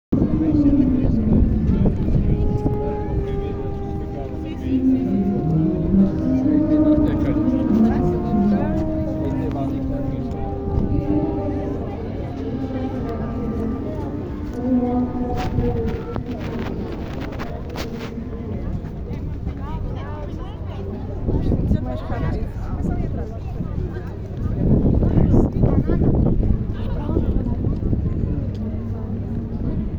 Soundscapes > Urban
20250516 1737 outdor music phone microphone
atmophere, recording, field